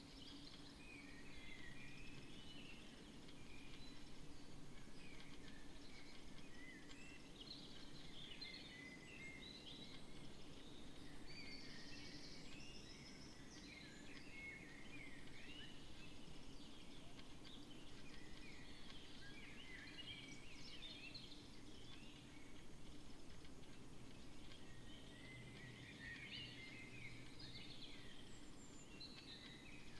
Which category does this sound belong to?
Soundscapes > Nature